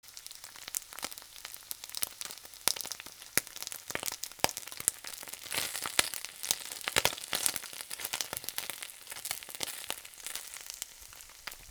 Sound effects > Objects / House appliances
Sizzling fish 02
Kitchen cooking sound recorded in stereo. Salmon on hot pan.
Cooking, Food, Household, Kitchen